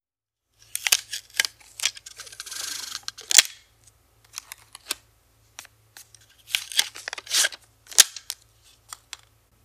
Sound effects > Objects / House appliances
Pulling tape
Pulling and tearing off pieces of tape